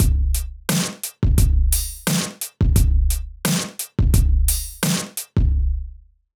Instrument samples > Percussion
boosted drum break
Made in FL Studio 21. The project the sample was inside of is 174 BPM.
break, bassy, rhythm, drum, drum-break, boosted, heavy